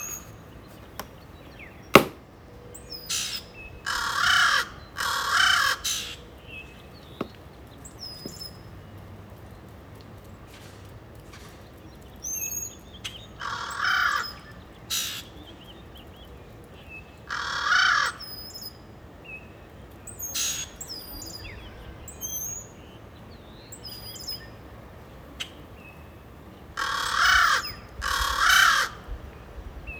Soundscapes > Nature
Recorded on iPhone16